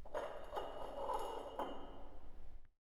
Sound effects > Objects / House appliances
A glass bottle rolling on a concrete floor (in the recycling room). Recorded with a Zoom H1.